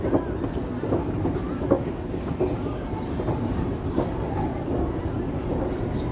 Sound effects > Other mechanisms, engines, machines
MACHEscl-Samsung Galaxy Smartphone, CU Escalator, Running, Looped Nicholas Judy TDC
An escalator running. Looped. Recorded at Macy's in Short Pump Town Center.